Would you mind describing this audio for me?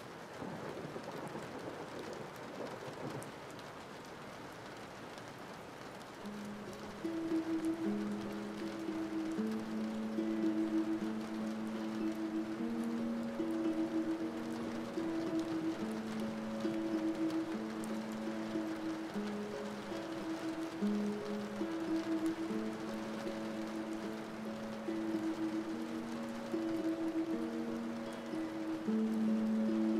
Music > Solo instrument
Melancholic ukulele playing